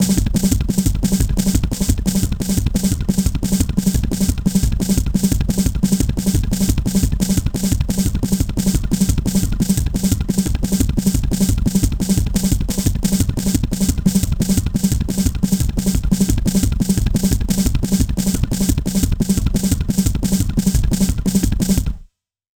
Instrument samples > Percussion
Simple Bass Drum and Snare Pattern with Weirdness Added 007
It's pretty much all in the name (and the tags). I took a simple 4/4 beat, snare on 1 and 3, bass on 2 and 4, and then I added erratic chains of effects that I primarily determined aleatorically. The result is sometimes noisy, sometimes it's fun or simply strange, but perhaps it could be useful to you in some way.
Noisy, FX-Drum, Interesting-Results, Experiments-on-Drum-Beats, Glitchy